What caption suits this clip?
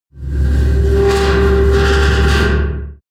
Sound effects > Other
Raw Industrial Recordings-Scratching Metal 008
Audio recorded by me. Field recording equipment: Tascam Portacapture x8 and microphone: RØDE NTG5. Raw recording file, basic editing in Reaper 7.
cinematic, metal, scraping, foley, experimental, mechanical, clang, scratching, rust, distorted, harsh, raw, impact, abstract, sound, metallic, textures, grungy, effects, sfx, rusted, industrial, sounds, drone, noise, found